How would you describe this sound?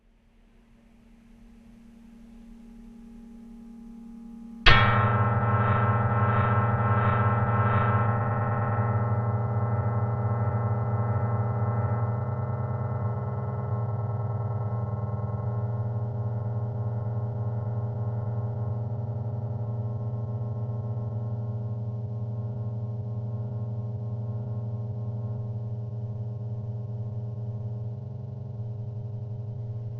Sound effects > Objects / House appliances
shot-bangchordstring-03
A collection of sounds made banging and scratching a broken violin